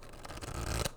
Sound effects > Objects / House appliances
GAMEMisc-Blue Snowball Microphone Cards, Shuffle 05 Nicholas Judy TDC
cards, shuffle, foley, Blue-Snowball, Blue-brand